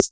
Instrument samples > Percussion
Welson-Rim 01
Electronic, Hi-Hats, Rare, Rim, Electro, Vintage, Retro, DrumMachine, Snare, Synth, DrumLoop, Drums